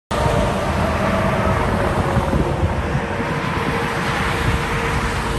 Sound effects > Vehicles
Sun Dec 21 2025 (14)
highway, road